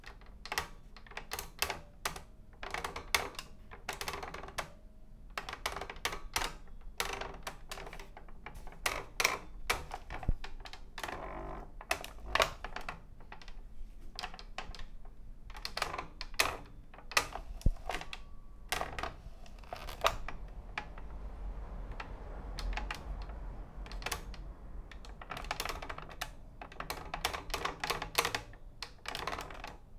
Sound effects > Objects / House appliances
squeaking of plastic desk lamp
A pinned rotating desk lamp with an articulated neck that squeaks very hard when moved. Recorded with Zoom H2.
squeaking, plastic, squeak, creak